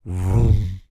Sound effects > Other
status dread
46 - Applying the "Dread" Status Foleyed with a H6 Zoom Recorder, edited in ProTools
dread, status